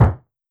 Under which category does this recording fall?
Sound effects > Human sounds and actions